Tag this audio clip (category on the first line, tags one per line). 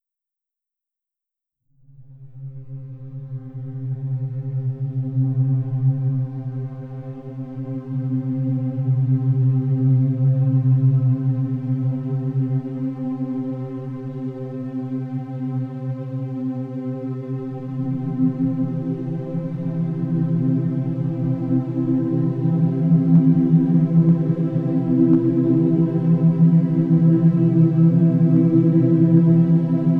Soundscapes > Other
heaven
ambient